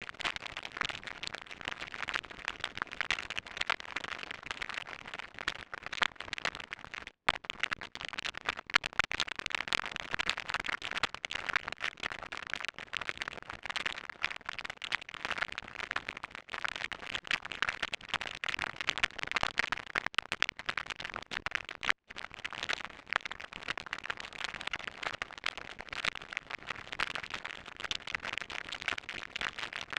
Sound effects > Electronic / Design
Textural SFX – Bubbling & Fire
Granularly reimagined from a cat toy squeak (Tascam DR-05), this hybrid texture merges liquid bubbling with ember-like crackle and gentle heat hiss. A versatile foundation for fantasy cauldrons, alien reactors, or abstract fire-liquid hybrids. If you enjoy these sounds, you can support my work by grabbing the full “Granular Alchemy” pack on a pay-what-you-want basis (starting from just $1)! Your support helps me keep creating both free resources and premium sound libraries for game devs, animators, and fellow audio artists. 🔹 What’s included?